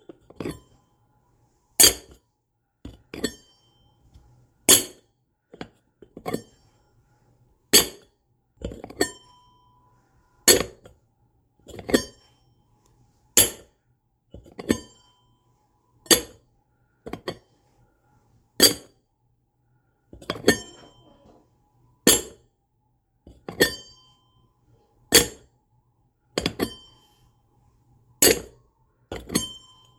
Sound effects > Objects / House appliances
A tin metal lid opening and closing.